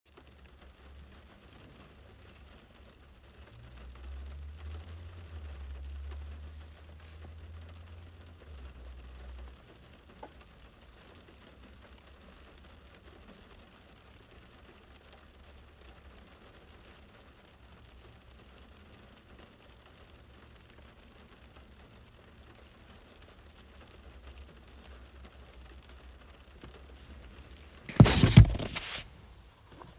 Soundscapes > Nature
rain in car (3)
Was in my car after work and recorded the sounds of the rain hitting from the inside
car, field-recording, nature, rain, rainstorm, storm, thunder, thunderstorm